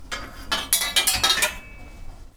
Sound effects > Objects / House appliances
Junkyard Foley and FX Percs (Metal, Clanks, Scrapes, Bangs, Scrap, and Machines) 48
Clank, scrape, Metallic, FX, Machine, rattle, Environment, Dump, waste, trash, Robotic, Smash, Bang, dumpster, dumping, Atmosphere, Ambience, Metal, Clang, Foley, Percussion, SFX, Junkyard, rubbish, Perc, Junk, garbage, Robot, tube, Bash